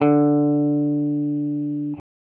Instrument samples > String
Random guitar notes 001 D3 03
guitar, electric, stratocaster, electricguitar